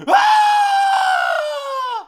Sound effects > Human sounds and actions
high scream male
horror, male, scream, voice